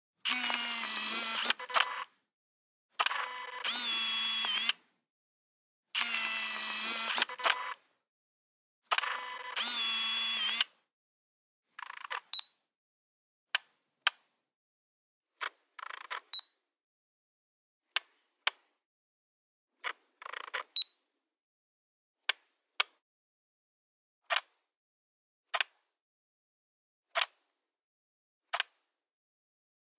Sound effects > Objects / House appliances
An old Canon point 'n shoot camera turning on and off, autofocusing, zooming in and out and taking a photo. Recorded with a Zoom H2n, using only the mid microphone (single cardioid condenser)